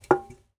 Sound effects > Objects / House appliances
33cl Cola can placed on wood - DJI-MIC3
Subject : A 33cl "tall" sodacan placed on a plank of wood. Date YMD : 2025 October 31 Location : Albi Indoor. Hardware : Two Dji Mic 3 hard panned. One close on the floor, another an arm's length away abour 30cm high. Weather : Processing : Trimmed and normalised in Audacity. Fade in/out Notes : Tips : Saying "Dual mono" and "synced-mono" in the tags, as the two mics weren't really intended to give a stereo image, just two positions for different timbres.
33cl, cola, DJI-mic3, empty, on-wood, placed, soda-can, tin